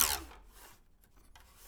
Sound effects > Other mechanisms, engines, machines
metal shop foley -144
fx, little, percussion, strike, tink